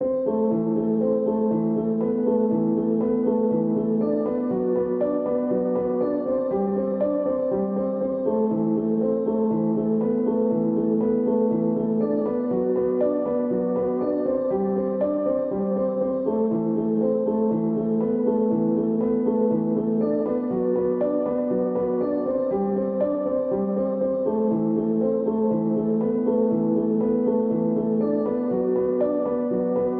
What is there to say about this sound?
Music > Solo instrument
Piano loops 085 efect 4 octave long loop 120 bpm
loop, samples, piano, simple, pianomusic, reverb, simplesamples